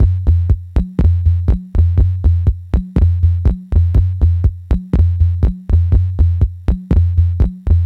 Music > Solo percussion
122 606Mod-BD Loop 06
606, Analog, Bass, Drum, DrumMachine, Electronic, Kit, Loop, Mod, Modified, music, Synth, Vintage